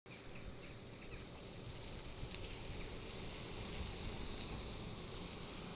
Sound effects > Vehicles
tampere bus12
bus passing by near Tampere city center